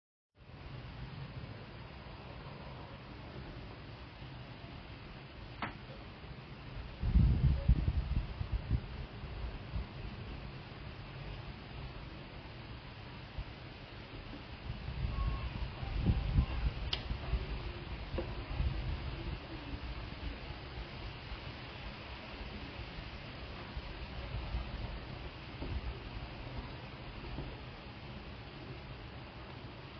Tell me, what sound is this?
Soundscapes > Nature
4. Focus & Relax Evolving Garden Ambience from Rain to Strong Wind
📝 Immerse yourself in 2 hours and 30 minutes of uninterrupted, uncut soundscape extracted from my original video that captures the breath-taking beauty of bright, bubbly clouds moving dynamically across the sky just after a rain shower, filmed with my phone (Samsung Galaxy s22) from a window overlooking tree tops and shrubs. This audio captures a rich mix of natural sounds🎵 which includes: 🌧️ Soft light rain falling at the beginning 🌬️ Strong winds rustling through trees and shrubs 🚗 Passing cars on a nearby road 🚉 Faint tram station ambience in the distance 👥 Gentle background voices from people nearby ☁️ Subtle cloud movement and stormy undertones 🌞 Sunlight shifting through leaves as the weather clears 🎧 This real-time, non-looped ambient recording is perfect for: 👉 Meditation & mindfulness 🧘‍♀️ 👉 Sleep & relaxation 😴 👉 Studying or deep focus 🎓 👉 Nature-inspired projects 🌿 👉 ASMR lovers seeking natural, layered soundscapes 🎧 📍 Recorded in an urban garden after rainfall.
AmbientSounds, ASMRNature, FocusSounds, GardenAmbience, GardenView, LightRain, NaturalAmbience, NatureASMR, RainAndWind, RelaxingSounds, Soundscape, SunOnLeaves, UncutNature, UrbanNature, WindSounds